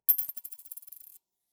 Sound effects > Objects / House appliances
coin foley coins change jingle tap jostle sfx fx percusion perc